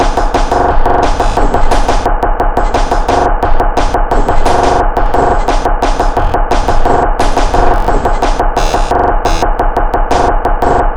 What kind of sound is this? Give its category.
Instrument samples > Percussion